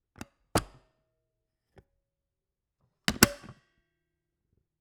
Sound effects > Objects / House appliances
250726 - Vacuum cleaner - Philips PowerPro 7000 series - Top handle n bucket lock Opening n closing
7000, aspirateur, cleaner, FR-AV2, Hypercardioid, MKE-600, MKE600, Powerpro, Powerpro-7000-series, Sennheiser, Shotgun-mic, Shotgun-microphone, Single-mic-mono, Tascam, Vacum, vacuum, vacuum-cleaner